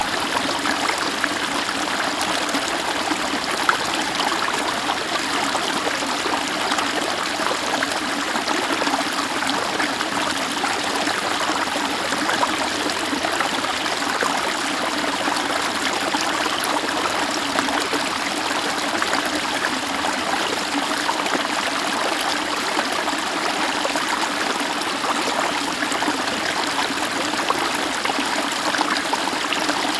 Sound effects > Natural elements and explosions
Forest Stream 2025-11-08 mono
I recorded a little stream in the Bavarian forests when I was on a Geocaching tour again. This sound was recorded in mono mode with the Shure MV88+ Video Kit in the so called "Rückersbacher Schlucht".
creek, stream, brook, field-recording, water, Shure, MV88